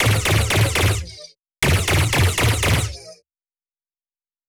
Sound effects > Other
06 - Weaponry - T-51 Carbine B
Hi-tech carbine. Burst fire.